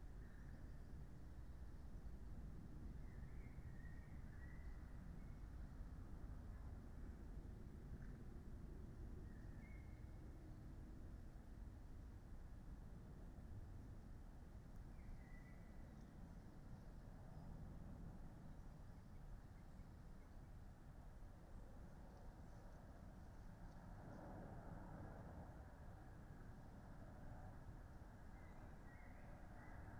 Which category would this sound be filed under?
Soundscapes > Nature